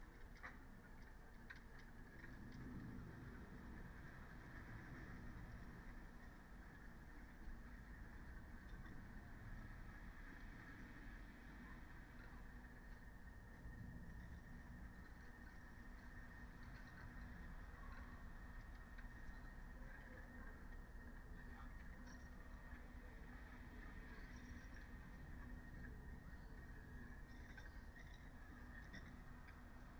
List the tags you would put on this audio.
Soundscapes > Nature
data-to-sound
Dendrophone
modified-soundscape
natural-soundscape
nature
phenological-recording
sound-installation
weather-data

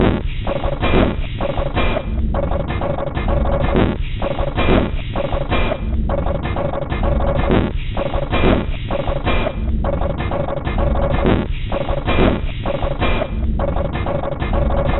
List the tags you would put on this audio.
Instrument samples > Percussion
Alien
Ambient
Dark
Drum
Industrial
Loop
Loopable
Soundtrack
Underground

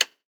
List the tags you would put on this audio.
Sound effects > Human sounds and actions
button
interface
off
switch
toggle

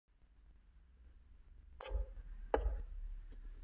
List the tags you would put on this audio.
Sound effects > Objects / House appliances
chess
fabric
object
slide
swish
wooden

